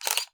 Sound effects > Other mechanisms, engines, machines
Camera Shutter
Canon T2i shuttering at 1/50
camera, canon, shutter